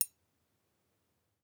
Sound effects > Other mechanisms, engines, machines
Spanner Click 03
sample, tool, click, spanner, garage